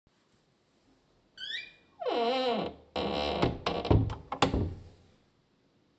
Soundscapes > Indoors
Creaking wooden door v02
Creaking wooden room door closes